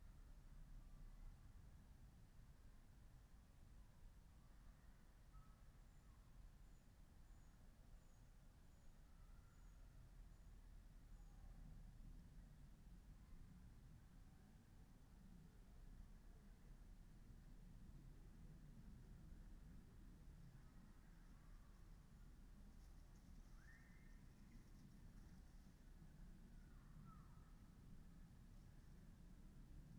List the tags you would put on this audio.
Soundscapes > Nature
artistic-intervention
data-to-sound
field-recording
modified-soundscape
phenological-recording
sound-installation

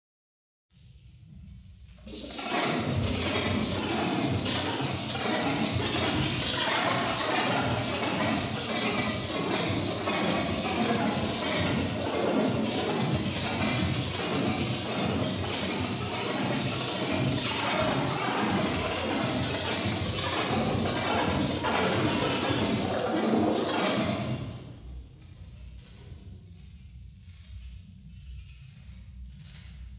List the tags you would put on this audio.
Music > Solo percussion
Bass-and-Snare
Bass-Drum
Experimental
Experimental-Production
Experiments-on-Drum-Beats
Experiments-on-Drum-Patterns
Four-Over-Four-Pattern
Fun
FX-Drum
FX-Drum-Pattern
FX-Drums
FX-Laden
FX-Laden-Simple-Drum-Pattern
Glitchy
Interesting-Results
Noisy
Silly
Simple-Drum-Pattern
Snare-Drum

